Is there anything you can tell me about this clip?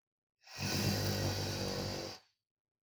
Sound effects > Human sounds and actions
When someone moves a chair, the sound of action. Recorded on a Samsung Galaxy Grand Prime.